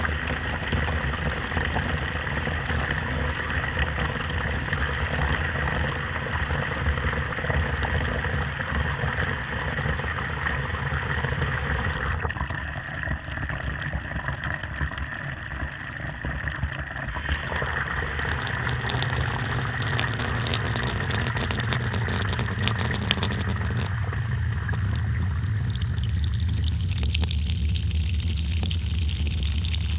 Sound effects > Objects / House appliances
A looping version of a recent hydrophone recording requested by a fellow member.
water, tap, hydrophome, bathrrom, sink